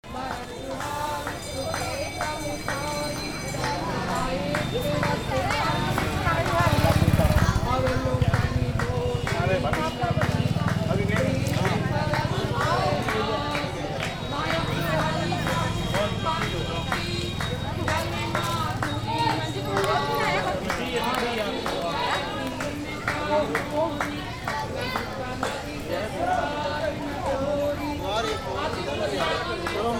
Soundscapes > Urban
Sound recorded in India where I explore the loudness produced by human activity, machines and environments in relation with society, religion and traditional culture.